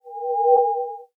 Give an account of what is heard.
Sound effects > Electronic / Design
Magic UI
A fast-panning 'magical' sound effect. Threw this into a cartoon short film.